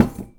Sound effects > Other mechanisms, engines, machines
metal shop foley -222
percussion, tink, shop, rustle, tools, perc, sfx, bam, pop, strike, knock, boom, thud, crackle, oneshot, sound, foley, metal, bop, bang, wood, little, fx